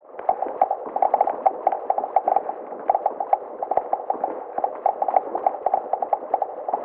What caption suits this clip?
Sound effects > Objects / House appliances
Boiling Water4
Boiling, Water, Bubble